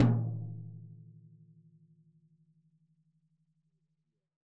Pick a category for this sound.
Music > Solo percussion